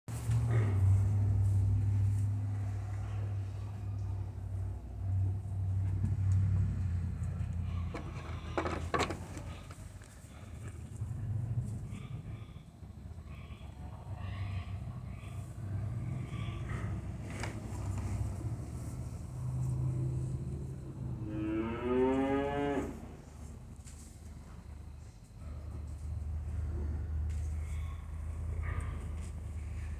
Sound effects > Animals
Livestock - Various; Pig, Cow, Goat, and Sheep
Various livestock vocalizing; 2nd to last bleat is a goat, and the very last bleat is a sheep; recorded with an LG Stylus 2022.